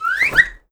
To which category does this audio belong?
Sound effects > Electronic / Design